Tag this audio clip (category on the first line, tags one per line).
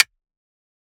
Instrument samples > Percussion
percussion
drum